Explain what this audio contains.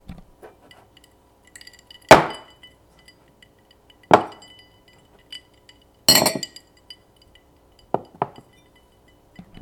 Sound effects > Objects / House appliances
Glasses on counter
Glasses with ice water, clinking, set down on counter.
glass, ice, clink, counter, glasses